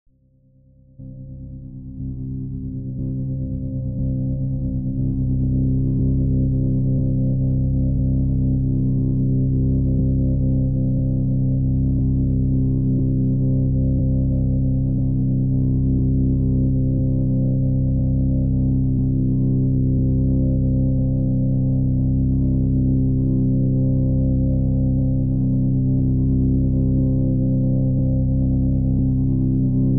Sound effects > Electronic / Design
A dark, evolving drone created using various plugins.